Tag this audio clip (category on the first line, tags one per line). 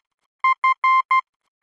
Sound effects > Electronic / Design
Language
Morse
Telegragh